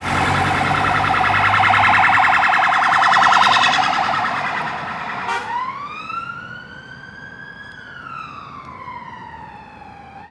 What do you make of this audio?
Soundscapes > Urban
Splott - Ambulance - Carlisle Street
fieldrecording, splott, wales